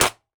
Sound effects > Objects / House appliances
33cl Cola can Crush - DJI-MIC3 - 3
Subject : A 33cl tall sodacan being crushed by foot on a plank of wood. In a basement. Date YMD : 2025 October 31 Location : Albi Indoor. Hardware : Two Dji Mic 3 hard panned. One close on the floor, another an arm's length away abour 30cm high. Weather : Processing : Trimmed and normalised in Audacity. Fade in/out Notes : Tips : Saying "Dual mono" and "synced-mono" in the tags, as the two mics weren't really intended to give a stereo image, just two positions for different timbres.